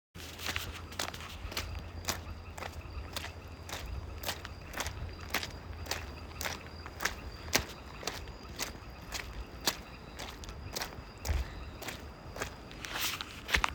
Sound effects > Human sounds and actions
Walking through the forest
naturaleza, walking, walk